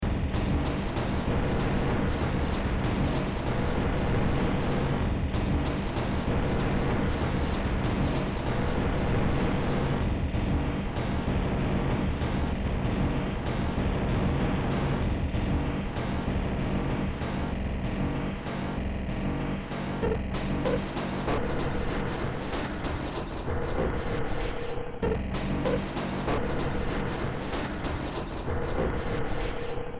Music > Multiple instruments
Demo Track #3405 (Industraumatic)
Sci-fi, Soundtrack, Industrial, Horror, Underground, Noise, Games, Cyberpunk, Ambient